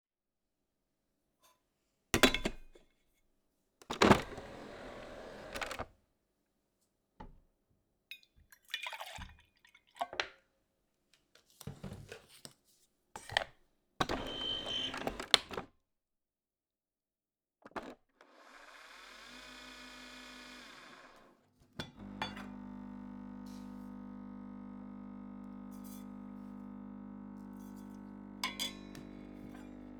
Sound effects > Objects / House appliances
FOODMisc making coffee nespresso virtuo
Making a Nespresso Virtuo coffee in a metal nespresso thermal sippy cup. including adding milk, sugar, stirring, putting on lid putting cup down on a hard surface tossing spoon into a sink. Stereo mike approx 15cm to the right of the coffee machine and the contact mike attached to the eject/open switch. Prcoessed in iZotope and Audacity to remove noise and adjust levels.
spoon, metallic, machine, drop, sfx, clink, espresso, Nespresso